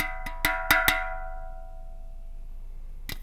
Sound effects > Other mechanisms, engines, machines
shop foley-018
percussion, wood, shop, perc, foley, bop, metal, knock, fx, bang, bam, sfx, rustle, little, pop, oneshot, crackle, boom, tools, tink, sound, strike, thud